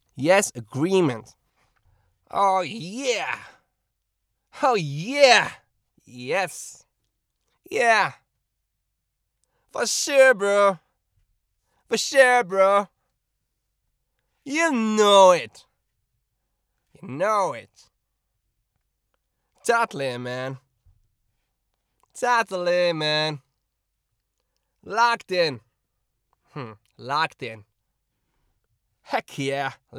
Speech > Solo speech
Surfer dude - kit - Aggrements (Kit)
Subject : Recording my friend going by OMAT in his van, for a Surfer like voice pack. Date YMD : 2025 August 06 Location : At “Vue de tout Albi” in a van, Albi 81000 Tarn Occitanie France. Shure SM57 with a A2WS windshield. Weather : Sunny and hot, a little windy. Processing : Trimmed, some gain adjustment, tried not to mess too much with it recording to recording. Done in Audacity. Some fade in/out if a one-shot. Notes : Tips : Script : Oh yeah. Yes Yea For sure, bro. You know it. Totally, man. Locked in. Heck yeah, let’s ride.
2025, 20s, A2WS, Adult, aggreement, August, Cardioid, Dude, English-language, France, FR-AV2, In-vehicle, kit, Male, mid-20s, Mono, ok, pack, RAW, Single-mic-mono, SM57, Surfer, Tascam, VA, Voice-acting, yes